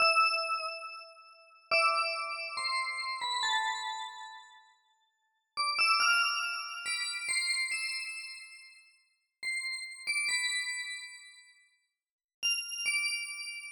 Music > Solo percussion
Bells 8bar Loop 140bpm
Made with FL studio